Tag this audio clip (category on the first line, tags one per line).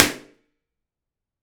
Sound effects > Other
Balloon
Balloon-pop
Bathroom
ECM-999
ECM999
FR-AV2
Home
Impulse
Impulse-And-Response
Impulse-Response
IR
Middle
Omni
Omnidirectional
Popping-Balloon
Response
Small
Superlux
Tascam